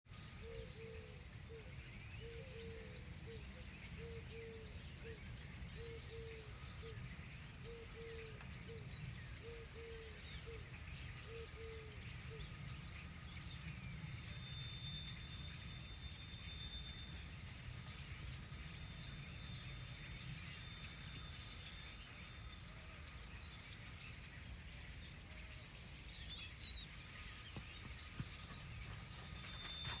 Soundscapes > Nature
Starlings, Dash and metal grinding 08/09/2023
Puppy is scared hearing the metal grinder for a first time
grinding, metal, puppy, scarred